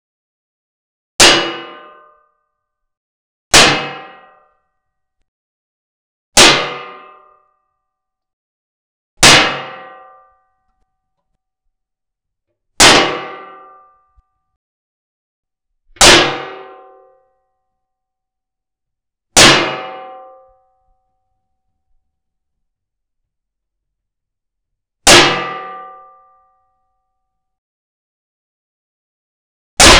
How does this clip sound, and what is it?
Sound effects > Objects / House appliances
metal tray dull ringout 01022026
sounds of metal hits sounds with dull ringouts. created using recordings of small cookie tray hitting a conventional oven baking tray on a dish rack with numerous dishes. effects I used in audacity are basically playing with the speed and put the original and the two sounds and layered them together. can work for heavy metal weapons, robot hitting robots, metal hitting metal, and armor hitting armor.